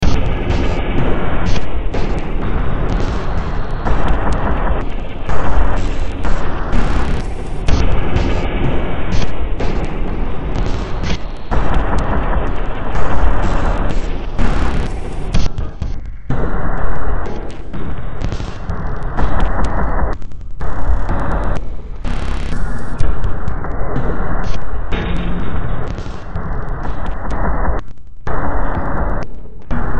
Music > Multiple instruments
Demo Track #3658 (Industraumatic)
Underground, Games, Sci-fi, Ambient, Industrial, Horror, Soundtrack, Noise, Cyberpunk